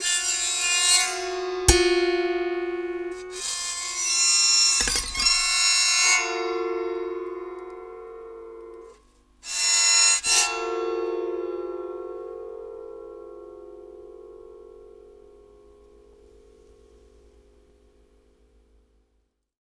Sound effects > Objects / House appliances
shot-bangchordstring-04
A collection of sounds made banging and scratching a broken violin